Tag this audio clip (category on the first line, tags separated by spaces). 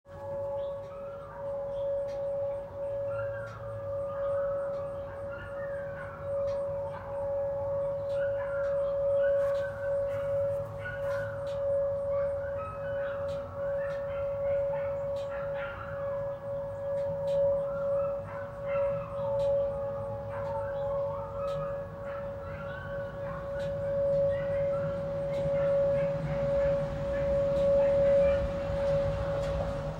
Sound effects > Other mechanisms, engines, machines
disaster siren test tornado warning